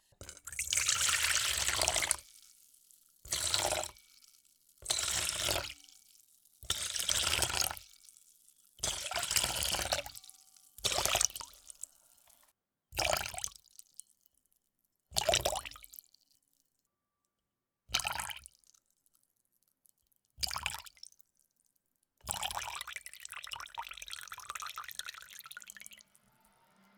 Sound effects > Objects / House appliances
Water Pour In Metal Bowl
Water pouring from a plastic watering can into a metal bowl. Recorded with Rode NTG5.
bowl, liquid, pour, pouring, water